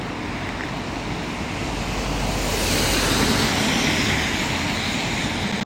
Sound effects > Vehicles
Car driving 14
Car sound recorded outdoors in Hervanta, Tampere using an iPhone 14 Pro. Recorded near a city street on a wet surface for a university vehicle sound classification project.
car, drive, engine, hervanta, outdoor, road, tampere